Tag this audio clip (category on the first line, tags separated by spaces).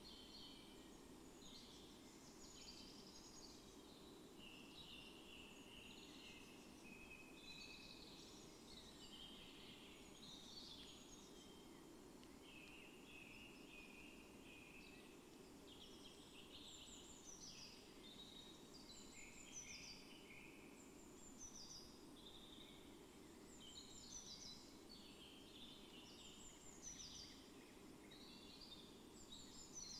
Soundscapes > Nature
alice-holt-forest data-to-sound Dendrophone field-recording modified-soundscape natural-soundscape nature phenological-recording sound-installation weather-data